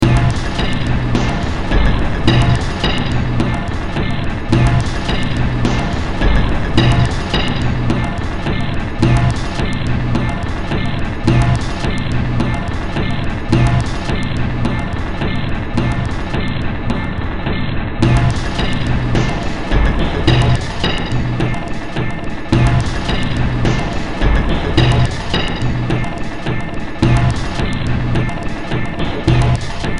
Music > Multiple instruments
Demo Track #3340 (Industraumatic)
Soundtrack, Sci-fi, Underground, Cyberpunk, Horror, Noise, Games, Ambient, Industrial